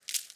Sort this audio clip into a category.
Sound effects > Natural elements and explosions